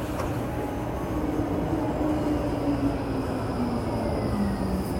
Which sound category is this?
Sound effects > Vehicles